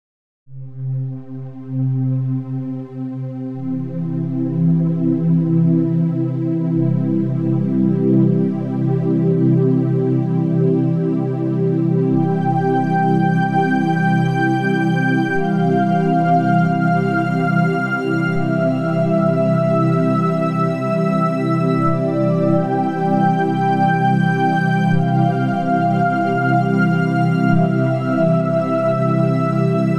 Soundscapes > Other
Angelic sounds
This is melody that inspires spirituality, it was made on FL Studio with the Sytrus Plugin, on the C Scale, perfect for a angelic scene on a independent movie, or a spiritual prayer, or something spiritual.
spiritual, seraphim, mystical